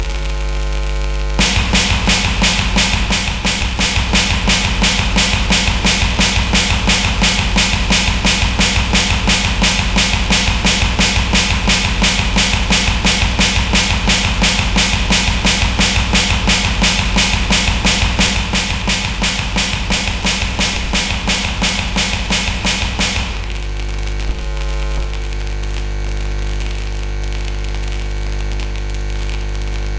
Music > Solo percussion
Simple Bass Drum and Snare Pattern with Weirdness Added 022
Noisy, Snare-Drum, FX-Laden, FX-Drum, FX-Drum-Pattern, Experiments-on-Drum-Beats, Simple-Drum-Pattern, Glitchy, Bass-and-Snare, Interesting-Results, Silly, Four-Over-Four-Pattern, Experimental, Fun, Experiments-on-Drum-Patterns, FX-Laden-Simple-Drum-Pattern, Bass-Drum, FX-Drums, Experimental-Production